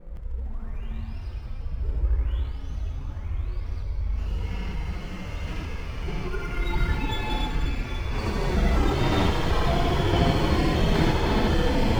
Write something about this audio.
Sound effects > Electronic / Design
Murky Drowning 10
science-fiction, horror, dark-techno, sci-fi, noise, noise-ambient, sound-design, content-creator, dark-soundscapes, mystery, cinematic, dark-design, vst, PPG-Wave, drowning